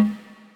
Music > Solo percussion
Snare Processed - Oneshot 112 - 14 by 6.5 inch Brass Ludwig
hits, ludwig, roll, processed, beat, rimshots, flam, kit, oneshot, reverb, acoustic, drum, rimshot, perc, brass, rim, snaredrum, hit, percussion, snareroll, drumkit, crack, snares, fx, sfx, realdrum, snare, drums, realdrums